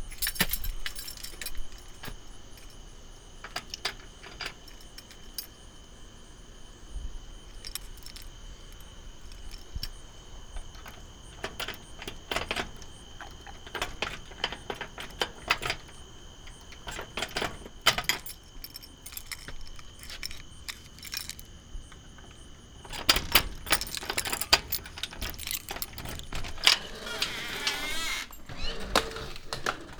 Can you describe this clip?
Sound effects > Objects / House appliances
Keys jangling alumn storm door oc sequence June 24 2025
A sound effect that I had no intention of posting. But, on a very hot, humid day in Illinois in late June of 2025, I went outside at night to record the ambiance of a small town neighborhood. My longform recording contains plenty of insects chorusing the night, a few cars slowly making their way--as if the extreme heat and humidity was making the engines run slower-and the ever-present background AC hum. Then as I was going inside with my gear I decided to keep my recording running as I jangled some keys and opened an aluminum storm door. Upon listening back, this sound really caught my attention. At the very beginning you hear the slight hum of the air conditioners everywhere, then at the same time I noticed the ever present higher-pitched all night buzzing of the various insects which often own the night. I decided that, sure, I had to share and listen.